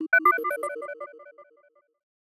Sound effects > Electronic / Design
Digital Interface SFX created using Phaseplant and Portal.
message, notification, selection, digital, interface, alert